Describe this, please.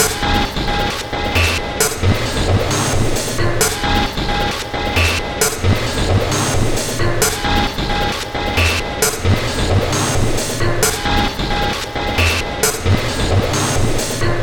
Instrument samples > Percussion
This 133bpm Drum Loop is good for composing Industrial/Electronic/Ambient songs or using as soundtrack to a sci-fi/suspense/horror indie game or short film.
Industrial, Samples, Loopable, Dark, Underground, Alien, Weird, Packs, Ambient, Loop, Soundtrack, Drum